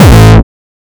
Instrument samples > Percussion
Hardcore&Frechcore Kick 2
Retouched Ekit kick in FLstudio original sample pack. Processed with ZL EQ, Waveshaper.
hardcore, hardstyle, kick, oldschool